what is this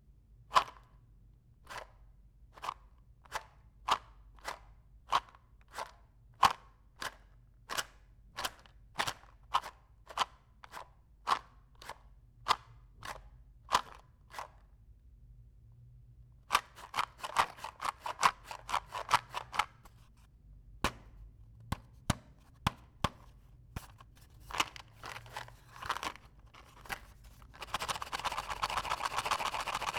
Sound effects > Objects / House appliances
large matchbox sound collection
Many sounds produced by a large matchbox through shaking, rattling etc. Recorded with Zoom H2.
matchbox, matchsticks